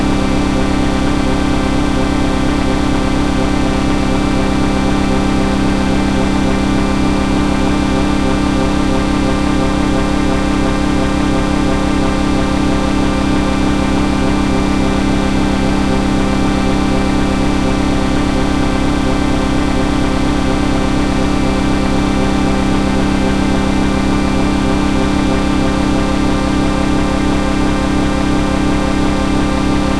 Soundscapes > Synthetic / Artificial
Drone sound 008 Developed using Digitakt 2 and FM synthesis